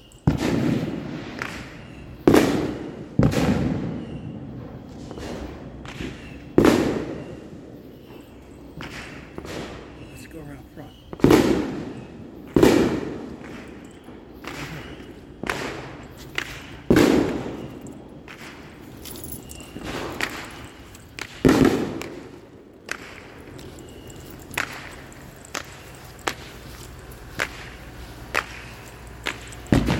Sound effects > Natural elements and explosions
FRWKComr-Samsung Galaxy Smartphone, CU Fireworks, Whistling, Crackling, Exploding Nicholas Judy TDC
Fireworks whistling, crackling and exploding. Could also be used for battle ambience. Some distant crickets in background. Nighttime